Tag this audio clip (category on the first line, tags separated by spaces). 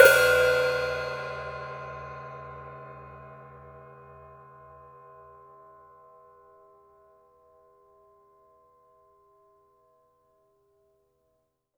Music > Solo instrument
Custom Cymbal Cymbals Drum Drums Hat Hats HiHat Kit Metal Oneshot Perc Percussion Vintage